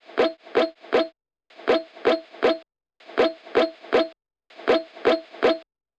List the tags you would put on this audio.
Music > Other
ptich
high
techno
guitar

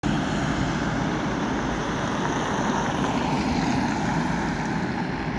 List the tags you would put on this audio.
Soundscapes > Urban
city
tyres
driving

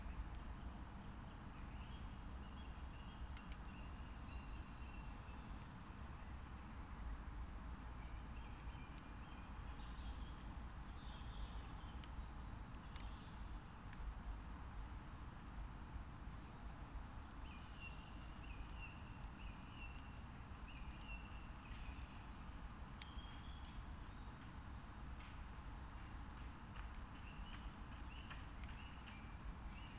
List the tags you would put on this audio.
Soundscapes > Nature
phenological-recording
alice-holt-forest
field-recording
raspberry-pi
meadow
natural-soundscape
soundscape
nature

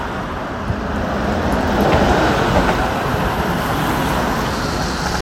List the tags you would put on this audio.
Soundscapes > Urban
bus
public
transportation

